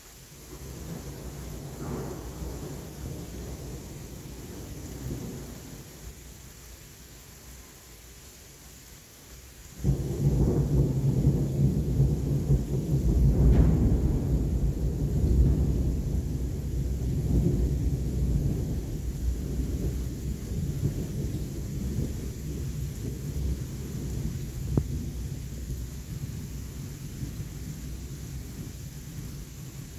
Sound effects > Natural elements and explosions
THUN-Samsung Galaxy Smartphone Lighting, Rippling, Distant, CU Thunder Boom Nicholas Judy TDC
Distant lighting rippling and close perspective thunder booms.